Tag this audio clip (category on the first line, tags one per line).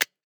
Sound effects > Human sounds and actions
activation
button
click
off
switch